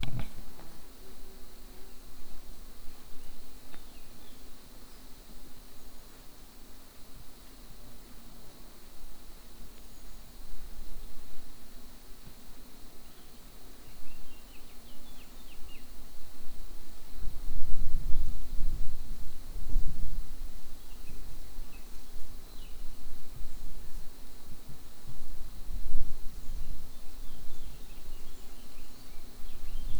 Soundscapes > Nature
A field recording in a forest in the early morning, crickets and birds can be heard, as well as a chainsaw or other machine far in the distance
crickets, field-recording, insects, morning, nature, night